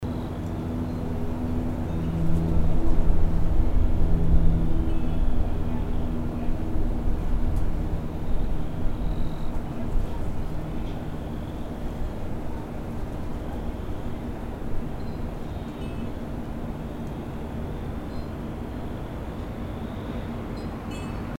Sound effects > Natural elements and explosions
EXTERIOR HOUSE GARDEN RESIDENTIAL AREA, CARS, CRICKETS, A LOT OF WIND, WIND CHIMES, MUMBERS, STEPS, DOORS
EXTERIOR GARDEN OF HOUSE RESIDENTIAL AREA, CARS, CRICKETS, A LOT OF WIND, WIND CHIMES, MURMURS, STEPS, DOORS. Full version of more than 20 minutes. Write to me for the full version.
HOUSE, GARDEN, town